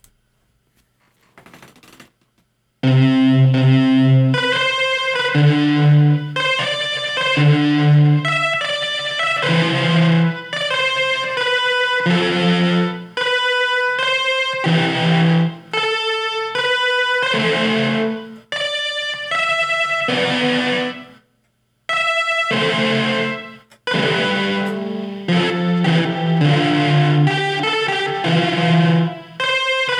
Soundscapes > Synthetic / Artificial
A freestyle symphony
experimental, synth